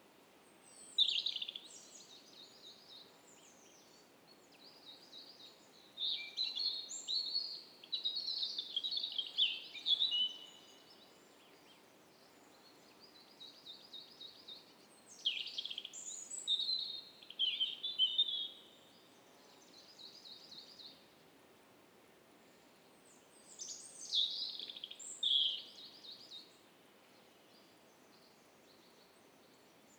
Soundscapes > Nature
Singing birds in the morning Mic: Matched stereo pair NT5 Rode Recorder: Zoom H5
ambiance, field-recording